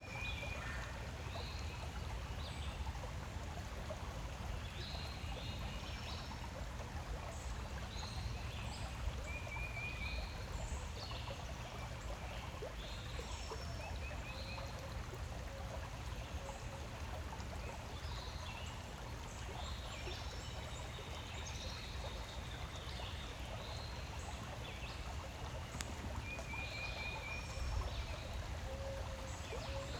Soundscapes > Nature
r20 babbling brook, birdies, morning dove
Babbling creek in my woods. Birdies chatting. Morning Dove. North Georgia, United States. Spring. Recorded on April 7th, 2025 with iPhone 11, version 16.2 using Voice Memos application. No additional microphones, mufflers, or devices. No modifications or edits. Pure sound. Pure nature.
stream, dove, brids, field-recording, woods, relaxing